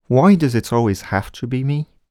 Speech > Solo speech
Sadness - why does it always have to be me
dialogue, FR-AV2, Human, Male, Man, Mid-20s, Neumann, NPC, oneshot, sad, Sadness, sentence, singletake, Single-take, talk, Tascam, U67, Video-game, Vocal, voice, Voice-acting